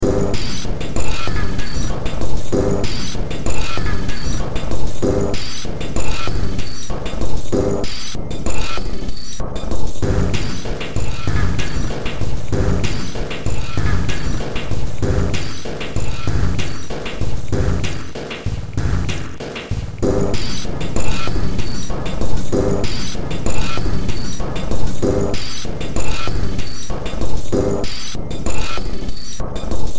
Music > Multiple instruments
Short Track #2961 (Industraumatic)
Ambient, Cyberpunk, Noise, Underground, Horror, Sci-fi, Industrial, Games, Soundtrack